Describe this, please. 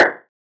Instrument samples > Percussion
IDM Percussion 4
Hi ! That's not recording sound :) I synth it with phasephant!